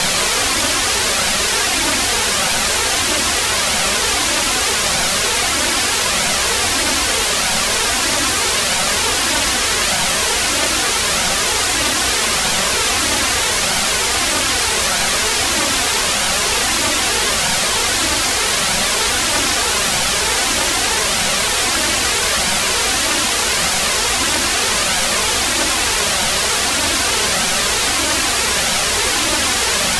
Instrument samples > Synths / Electronic
Noise Oscillator - Roland Juno 6 with Chorus 1